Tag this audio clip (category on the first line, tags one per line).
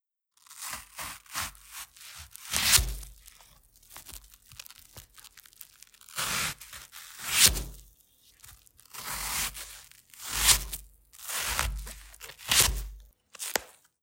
Sound effects > Objects / House appliances
apple
blade
board
cake
chef
cook
cooking
cut
cutting
dough
food
fruit
juice
juicy
kitchen
knife
meal
miam
pastry
peel
restaurant
slice
slicing
taste
tasty
vegetable
vegetables
yum
yummy